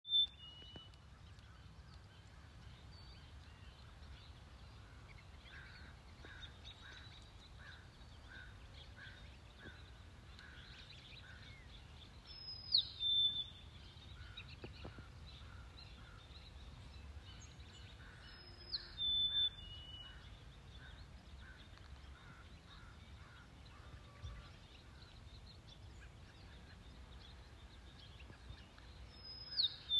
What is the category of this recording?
Soundscapes > Nature